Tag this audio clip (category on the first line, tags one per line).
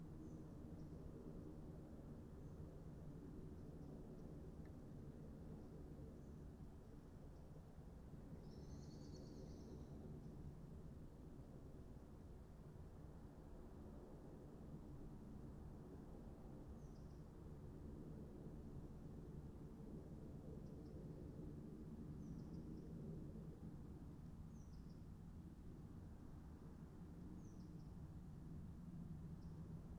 Soundscapes > Nature
alice-holt-forest
data-to-sound
Dendrophone
natural-soundscape
phenological-recording
raspberry-pi
sound-installation
weather-data